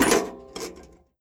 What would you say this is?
Sound effects > Objects / House appliances
TOYMisc-Samsung Galaxy Smartphone, CU Jack In The Box, Pop Open Nicholas Judy TDC
A jack in the box popping open. Recorded at Goodwill.